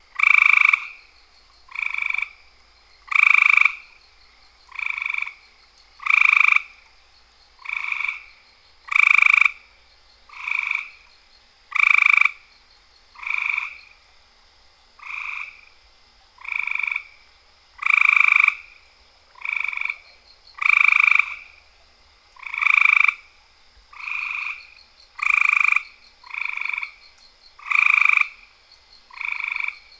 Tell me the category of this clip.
Sound effects > Animals